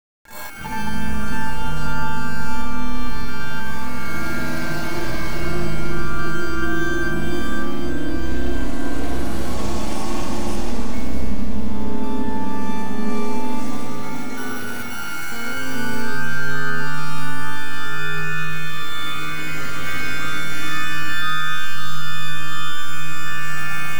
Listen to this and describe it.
Soundscapes > Synthetic / Artificial
Trickle Down The Grain 1
electronic, experimental, free, glitch, granulator, noise, packs, sample, samples, sfx, sound-effects, soundscapes